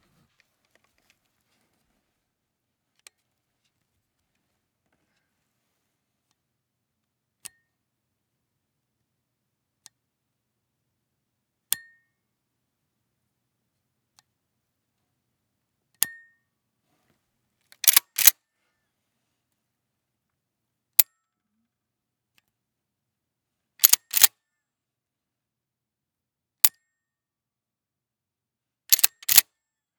Sound effects > Other mechanisms, engines, machines
Pulling and releasing a Remington 870's trigger. REMINGTON 870 TRIGGER